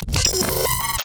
Sound effects > Experimental
Gritch Glitch snippets FX PERKZ-003
clap, crack, edm, experimental, fx, glitchy, impact, impacts, otherworldy, perc, pop, sfx, snap, zap